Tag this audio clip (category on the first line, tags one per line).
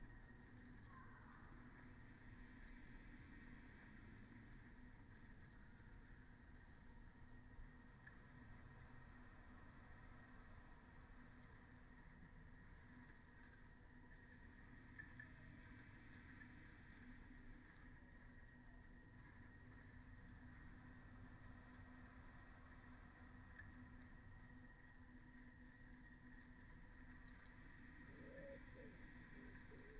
Soundscapes > Nature
alice-holt-forest
artistic-intervention
Dendrophone
field-recording
modified-soundscape
natural-soundscape
phenological-recording
raspberry-pi
sound-installation
soundscape
weather-data